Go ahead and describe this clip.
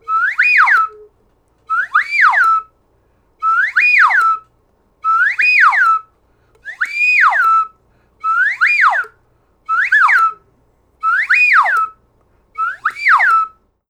Sound effects > Objects / House appliances
A slide whistle up and down.